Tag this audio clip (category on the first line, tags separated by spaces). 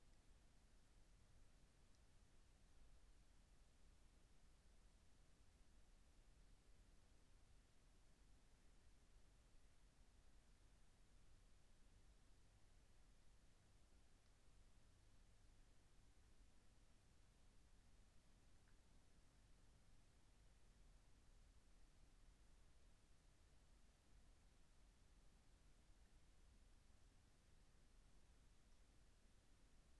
Soundscapes > Nature
sound-installation soundscape